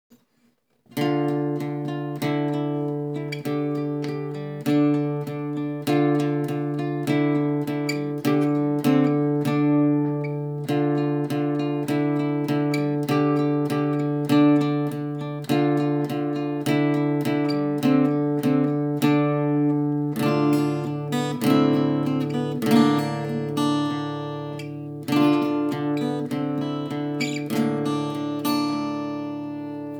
Instrument samples > String
Medieval Strings
Melancholic harmonic medieval Guitar